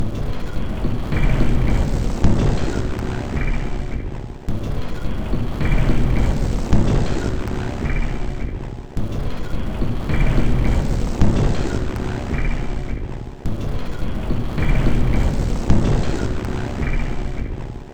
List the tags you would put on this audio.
Instrument samples > Percussion
Loopable
Drum
Dark
Ambient
Loop
Weird
Soundtrack
Samples
Packs
Alien
Underground
Industrial

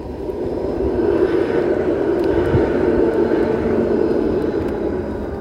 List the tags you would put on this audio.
Soundscapes > Urban
tram
vehicle

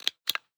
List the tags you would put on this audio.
Sound effects > Human sounds and actions
switch
off
toggle
interface
click
activation
button

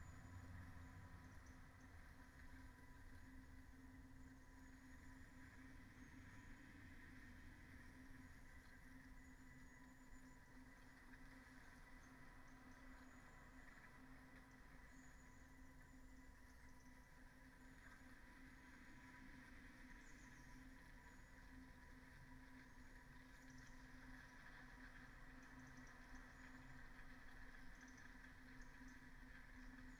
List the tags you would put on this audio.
Soundscapes > Nature
modified-soundscape
artistic-intervention
alice-holt-forest
sound-installation
natural-soundscape

